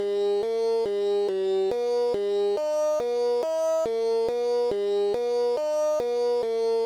Music > Solo instrument
Dilruba Loop
A loop made using a dilruba sample
Drill, Garage, Grime, Hiphop, Instrument, Jazz, Jungle, Loop, New, Stringed, Trap